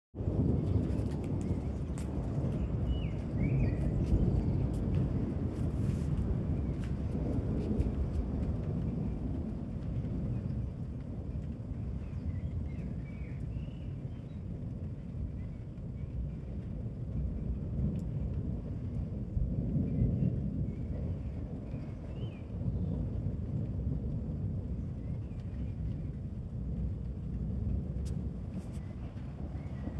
Sound effects > Natural elements and explosions
Summer Storm in Sicilian Countryside
nature, wind, sicilia, thunder, field-recording, storm, birds